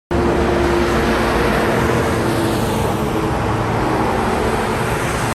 Sound effects > Vehicles
Sun Dec 21 2025
highway, road, truck